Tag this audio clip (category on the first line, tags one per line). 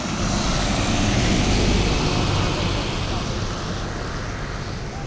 Sound effects > Vehicles
transportation
vehicle